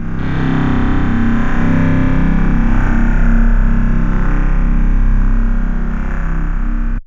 Instrument samples > Synths / Electronic
CVLT BASS 20
bassdrop, synth, drops, synthbass, wobble, wavetable, low, bass, stabs, subs, sub, lfo, lowend, clear, subbass, subwoofer